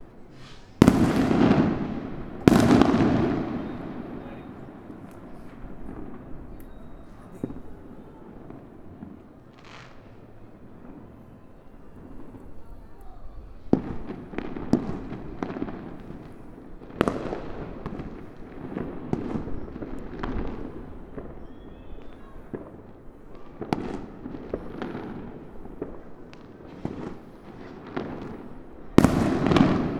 Sound effects > Other
Fuegos artificiales en la distancia. Grabado con Tascam DR-05X / Fireworks in the distance. Recorded with a Tascam DR-05X